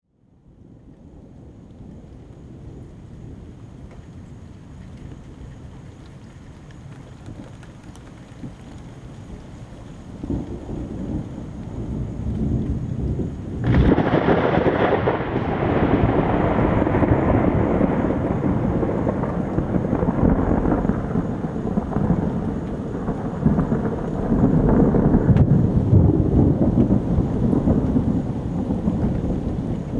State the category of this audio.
Soundscapes > Nature